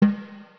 Music > Solo percussion
Snare Processed - Oneshot 146 - 14 by 6.5 inch Brass Ludwig
acoustic, beat, drum, drums, flam, fx, hits, kit, ludwig, perc, percussion, realdrums, reverb, rim, rimshot, rimshots, sfx, snaredrum, snares